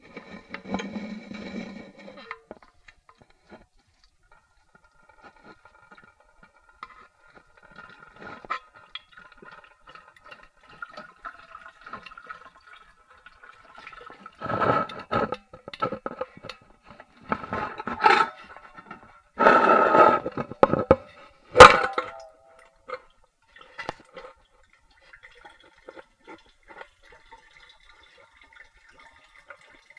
Sound effects > Experimental
contact mic in metal thermos, dragging, handling, emptying
The sound of me trying and failing at first to empty out a giant thermos. Recorded with a contact microphone.
contact-microphone, thermos